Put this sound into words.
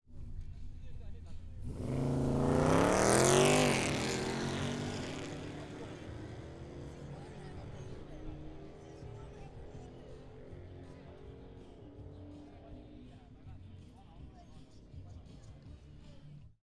Soundscapes > Other
Wildcards Drag Race 2025
Left: DPA 4055 Kick-Drum Microphone Right: AUDIX D6 Portable audio recorder: Sound Devices MixPre-6 II Wildcards Drag Race, which took place on August 17, 2025, in Estonia, on the grounds of the former military airfield in Klitsi. I carried out several tests with different microphones and various setups. This particular clip was made using one specific configuration.
Drag, Race, Wildcards